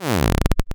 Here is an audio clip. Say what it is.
Sound effects > Other
battle loss
48 - Losing a battle Synthesized using ChipTone, edited in ProTools